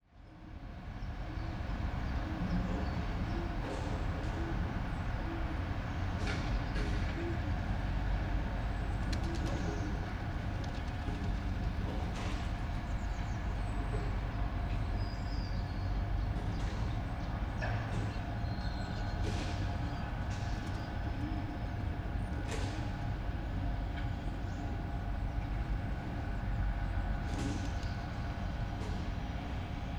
Soundscapes > Urban
amb city suburb activities kengwai cct
Atmosphere of Saint-Ouen, in the Paris region near a postal sorting center. Recorded with a Zoom H1n and two Earsight Standard microphones (Immersive Soundscapes), low-cut at 80 Hz. 11/08/2025 – 9:00 AM
Ambiant, City, Ambiance, Urban, Paris